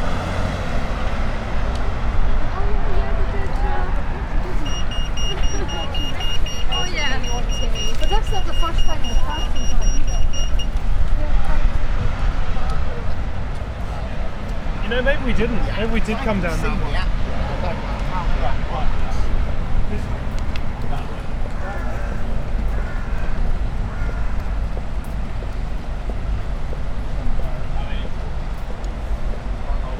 Soundscapes > Urban
A walk through london
Walking through the London traffic on the way back from a shoot. lots of engine motors busses traffic lights and chatter
ambience, lights, london, traffic, urban